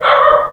Sound effects > Animals
Distant Dog Bark
Bark said the dog.